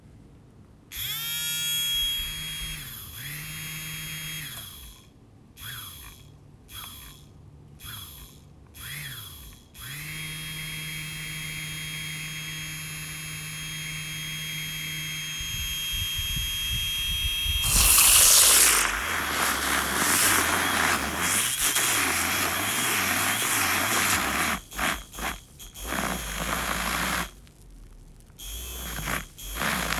Sound effects > Objects / House appliances
Milk frother run. Several ons and offs. Then froths milk.
milk, latte, frother, appliance, froth, whir, coffee